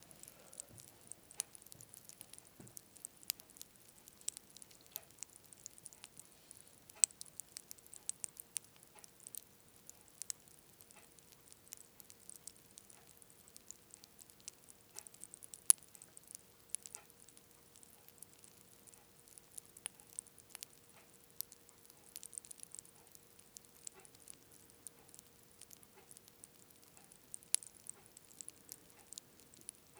Sound effects > Objects / House appliances
crackling seeds
Seeds are popping / snapping / crackling, emittting their high-pitched clicks, just after they got roasted. In the background, the regular tic-tac of a wall clock. 2 x EM272 Micbooster mics, Tascam FR-AV2
click, crack, crackle, pop, roast, seeds, snap, snapping